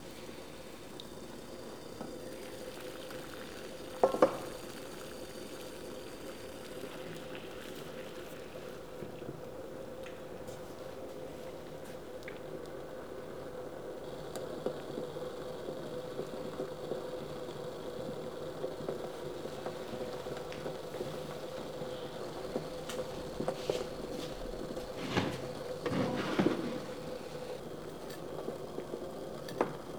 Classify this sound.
Sound effects > Objects / House appliances